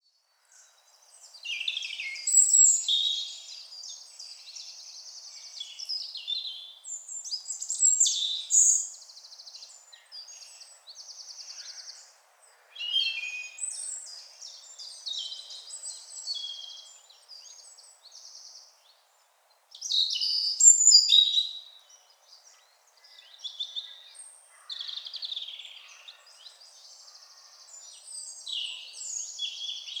Soundscapes > Nature
A recording from Hopwas woods. edited using RX11.